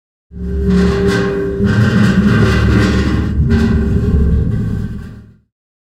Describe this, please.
Sound effects > Other
Raw Industrial Recordings-Scratching Metal 002
abstract, cinematic, clang, distorted, drone, effects, experimental, foley, found, grungy, harsh, impact, industrial, mechanical, metal, metallic, noise, raw, rust, rusted, scraping, scratching, sfx, sound, sounds, textures